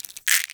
Sound effects > Objects / House appliances
Pill Bottle Shake 4
Pill bottle sound effects
bottle, opening, pill, shaking, open, plastic, pills